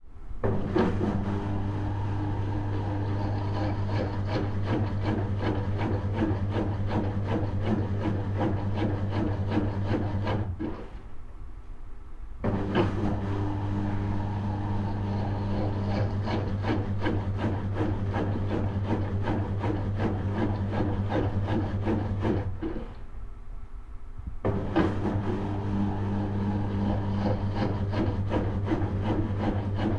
Sound effects > Objects / House appliances
Some clean up using iZotope RX11 and rendered in Reaper.